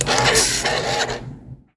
Sound effects > Objects / House appliances
MACHMisc-Samsung Galaxy Smartphone, CU Glade Air Freshener, Air Out, Servo Motor Nicholas Judy TDC
A glade air freshener dispenses air out of it with servo motor.